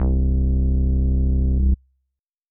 Instrument samples > Synths / Electronic
VSTi Elektrostudio (Model Mini+Micromoon)